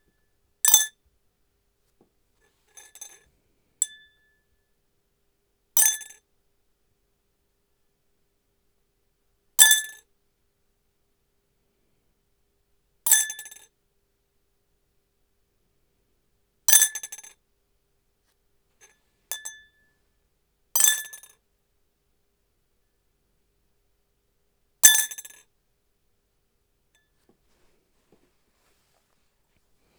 Sound effects > Objects / House appliances
Throwing a spoon into an empty glass
I throw an empty steel spoon into an empty glass. This audio is presented exactly as recorded, with no editing, processing, noise reduction or added effects
spoon, kitchen, glass